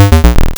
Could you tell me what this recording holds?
Sound effects > Electronic / Design
retro game death sound
Death sound effect for a retro plattform videogame. Created in Python with the pydub library.